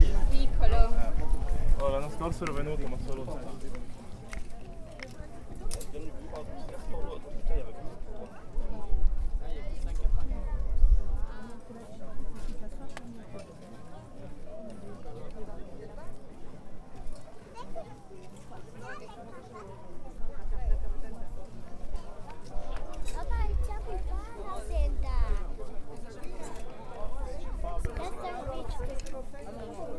Soundscapes > Urban
Calpe Market 1
street, Tascam-DR-40X, chatter, people, spanish, voices, field-recording, market, wind-clipping-noise